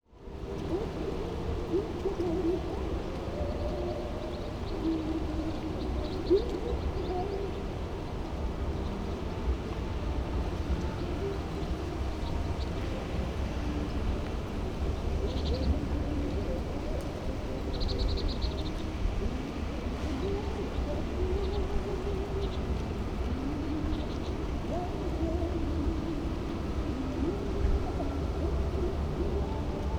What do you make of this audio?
Soundscapes > Urban
Distant North Korean Music Across the Border
Recorded at the Peace Observatory in the Civilian Control Zone, Ganghwa Island, Korea.
Strong winds dominate the riverside soundscape, while faint music from North Korea
can occasionally be heard drifting across the river beyond the barbed wire. Recording: Zoom F3 / ORTF stereo / Earsight The One microphone
korea, distant-music, river, ganghwa, border, ambience, peace-observatory, wind, north-korea, dmz, field-recording